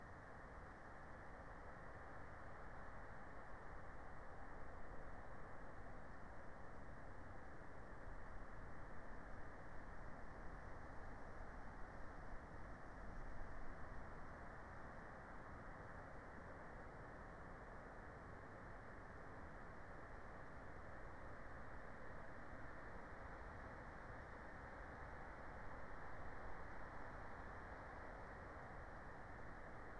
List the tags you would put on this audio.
Soundscapes > Nature
meadow
phenological-recording
natural-soundscape
nature
soundscape
alice-holt-forest
raspberry-pi
field-recording

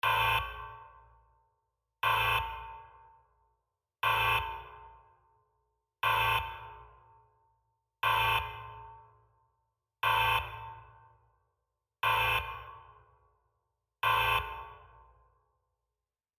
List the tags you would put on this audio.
Sound effects > Experimental
experimental
saw

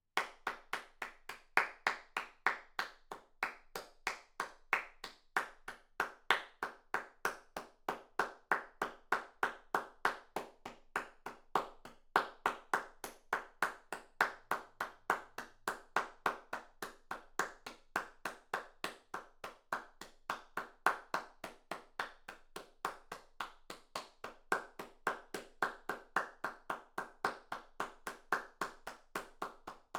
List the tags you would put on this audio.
Sound effects > Human sounds and actions
Tascam
AV2
indoor
solo
XY
Rode
NT5
clap
Applaud
FR-AV2
Solo-crowd
person
Applauding
individual
Applause
clapping

